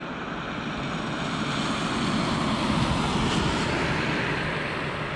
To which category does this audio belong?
Soundscapes > Urban